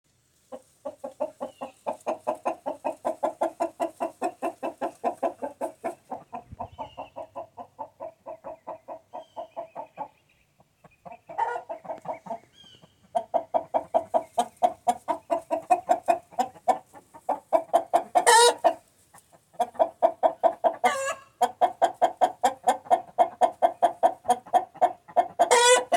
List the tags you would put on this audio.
Sound effects > Animals
animal bird chickens cluck clucking gallus hen

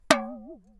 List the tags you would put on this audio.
Sound effects > Objects / House appliances
fieldrecording fx metal industrial oneshot foley perc percussion sfx foundobject drill mechanical natural clunk glass bonk hit object stab

